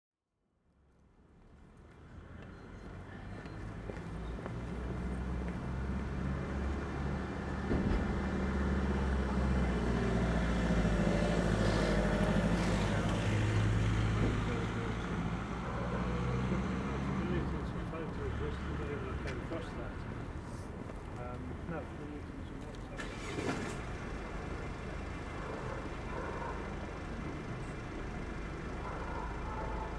Soundscapes > Urban
Recording number 2 of Lichfield city centre using my new Roland CS-10EMs.
people, field-recording, soundscape, ambience, public, city-centre, lichfield